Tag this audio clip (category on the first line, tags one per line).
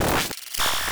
Sound effects > Electronic / Design
digital
stutter
one-shot
pitched
glitch
hard